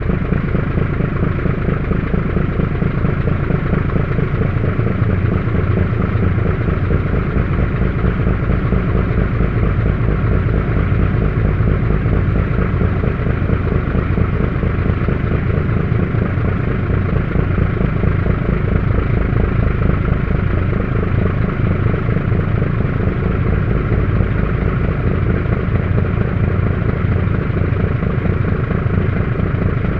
Sound effects > Other mechanisms, engines, machines
250827 110116 PH Ferryboat engine
Ferryboat engine at cruise speed. (Take 2) Recorded between Calapan city and Batangas city (Philippines), in August 2025, with a Zoom H5studio (built-in XY microphones). Fade in/out applied in Audacity.